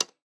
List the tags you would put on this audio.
Sound effects > Human sounds and actions
click switch interface

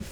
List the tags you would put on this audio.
Sound effects > Objects / House appliances
cleaning handle spill lid clang kitchen container scoop carry shake bucket foley plastic knock pour slam drop water fill object pail clatter garden tip liquid metal tool debris hollow household

